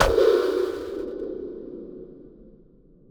Sound effects > Experimental
snap crack perc fx with verb -glitchid 0010
idm, clap, laser, crack, sfx, perc, pop, glitch, alien